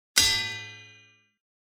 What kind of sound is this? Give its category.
Sound effects > Objects / House appliances